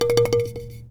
Music > Solo instrument
Marimba Loose Keys Notes Tones and Vibrations 5
block, foley, fx, keys, loose, marimba, notes, oneshotes, perc, percussion, rustle, thud, tink, wood, woodblock